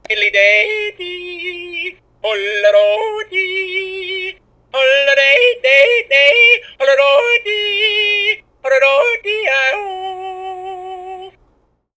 Sound effects > Objects / House appliances
TOYElec-Blue Snowball Microphone, CU Yodeling Pickle Nicholas Judy TDC
An electronic yodeling pickle.
Blue-brand, Blue-Snowball, electronic, pickle, toy, yodel